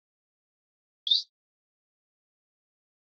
Sound effects > Animals
Bird Tweet 1
Bird sound made with my voice.
voice, chirp, bird